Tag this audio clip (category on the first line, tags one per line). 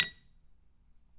Sound effects > Objects / House appliances
foundobject
stab
percussion
bonk
mechanical
clunk
perc
sfx
hit
object
drill
natural
industrial
glass
metal
fieldrecording
foley
fx
oneshot